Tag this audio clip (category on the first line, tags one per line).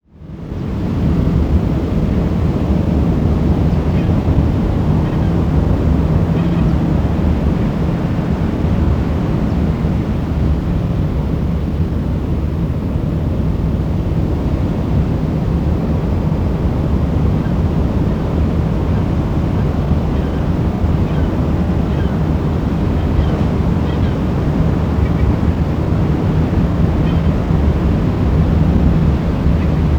Soundscapes > Nature
recording
Puchuncavi
cave
sea
Chile
south
Valparaiso
america
field